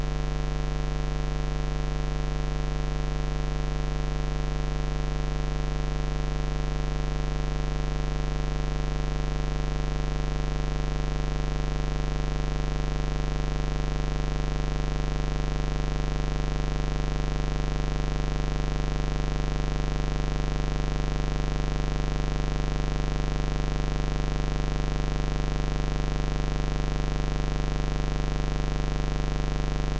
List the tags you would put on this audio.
Sound effects > Electronic / Design
AC
synth
static
50hz
electric
groundloop
noise
long

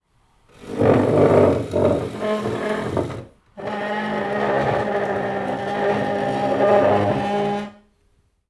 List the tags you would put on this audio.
Sound effects > Objects / House appliances
chair
drag
dragging
floor
furniture
laminate
scrape
scraping
squeaky
wood

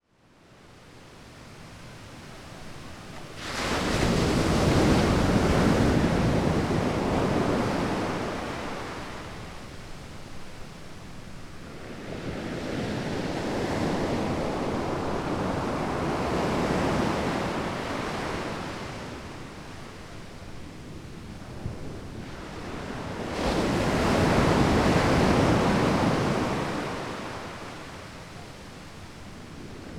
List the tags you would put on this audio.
Soundscapes > Nature
atmosphere
morning
Philippines
waves
sand
coast
sea-waves
Pacific
soundscape
surf
Puerto-Galera
wave
surfing
kids
beach
splash
people
field-recording
adults
shore
voices
ambience
splashing
nature
children
ocean
sea

